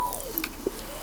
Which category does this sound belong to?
Sound effects > Objects / House appliances